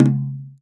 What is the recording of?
Music > Solo instrument
Toms Misc Perc Hits and Rhythms-004
Crash, Custom, Cymbal, Cymbals, Drum, Drums, FX, GONG, Hat, Kit, Metal, Oneshot, Paiste, Perc, Percussion, Ride, Sabian